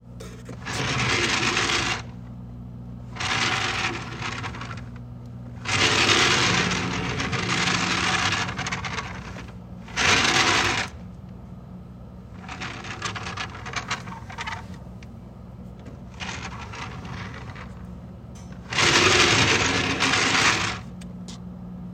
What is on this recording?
Sound effects > Objects / House appliances
Lazy Susan Revolving Tray
This is a "Lazy Susan" revolving tray or spinning platter. Standard kitchen device being spun around.
domestic-sounds, kitchen, platter